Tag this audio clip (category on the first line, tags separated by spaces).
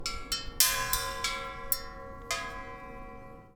Sound effects > Objects / House appliances
Junkyard scrape Clank tube Ambience Dump Clang Perc Bang FX Environment SFX dumping Junk waste rubbish Robot Smash dumpster Foley Metal Robotic Machine rattle garbage trash Atmosphere Metallic Percussion Bash